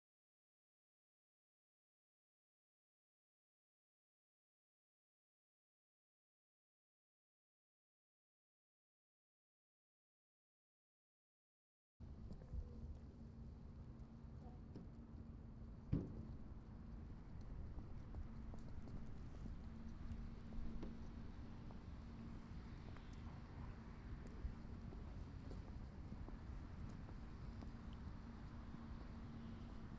Sound effects > Other
wind and foot steps
31s wind and footsteps sound
footsteps, walking, windy